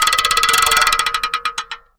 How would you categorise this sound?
Sound effects > Objects / House appliances